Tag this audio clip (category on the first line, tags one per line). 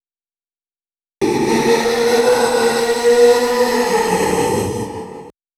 Sound effects > Human sounds and actions
Horror
Monster